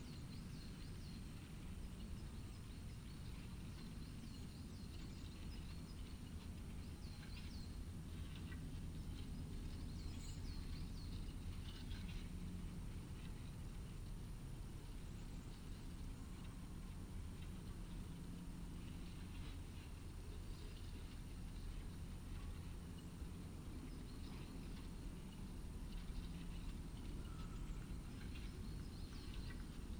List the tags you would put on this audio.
Soundscapes > Nature
raspberry-pi Dendrophone weather-data field-recording modified-soundscape nature data-to-sound soundscape natural-soundscape phenological-recording alice-holt-forest artistic-intervention sound-installation

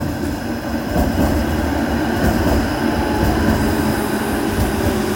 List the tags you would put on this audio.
Sound effects > Vehicles
Tampere tram vehicle

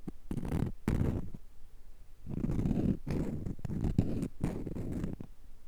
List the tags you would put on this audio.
Sound effects > Objects / House appliances
Dare2025-09 Dare2025-Friction file filing-nail friction metal Nail-file rubbing

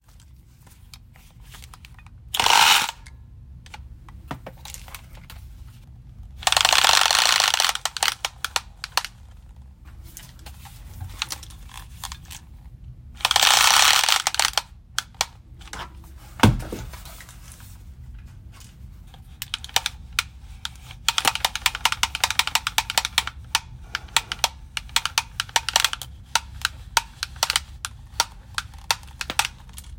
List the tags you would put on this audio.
Sound effects > Objects / House appliances
wire retracting

